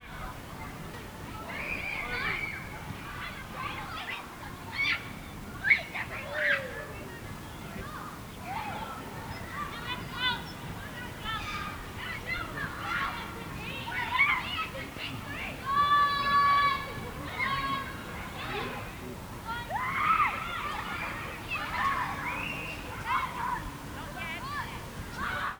Soundscapes > Urban
Sounds from a busy playground/park whilst walking the dog.